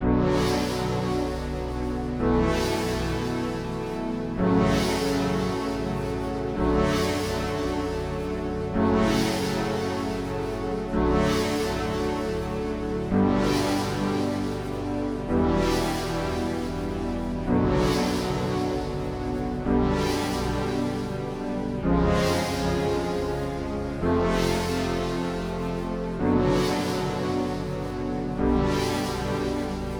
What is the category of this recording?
Music > Multiple instruments